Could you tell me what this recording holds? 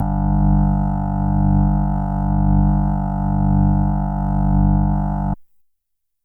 Instrument samples > Synths / Electronic
Synth organ patch created on a Kawai GMega synthesizer. G3 (MIDI 55)